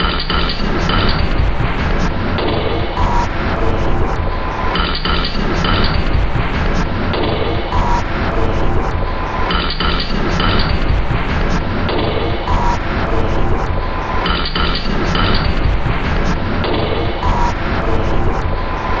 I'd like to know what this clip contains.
Instrument samples > Percussion
This 101bpm Drum Loop is good for composing Industrial/Electronic/Ambient songs or using as soundtrack to a sci-fi/suspense/horror indie game or short film.
Underground, Packs, Ambient, Dark, Soundtrack, Samples, Industrial, Alien, Drum, Loop, Loopable, Weird